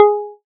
Instrument samples > Synths / Electronic
APLUCK 2 Ab
Synthesized pluck sound
additive-synthesis, fm-synthesis, pluck